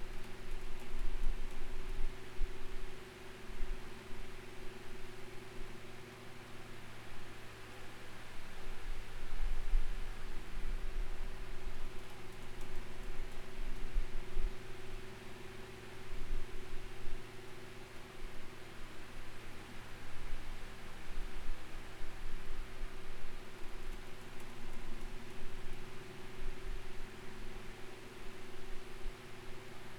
Sound effects > Objects / House appliances
Two electric fans in a garage. Recorded with a TASCAM DR-70D with external sE7 mics.
fans, garage